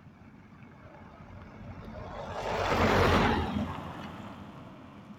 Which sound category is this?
Sound effects > Vehicles